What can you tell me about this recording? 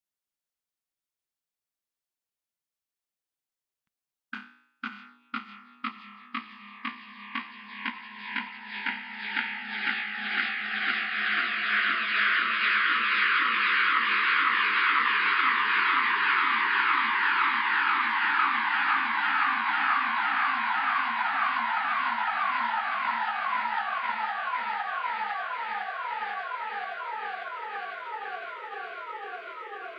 Sound effects > Experimental
Noticed that one instance of Inner Pitch 2 was creating a feedback loop when working on a track in FL Studio, and I liked how it sounded, so recorded it through OBS and did some minor adjustments with audio normalization and fading via Audacity. Suitable for distinct/bohemian electronic environments.